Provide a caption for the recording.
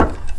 Sound effects > Other mechanisms, engines, machines
metal shop foley -004
boom, little, pop, sound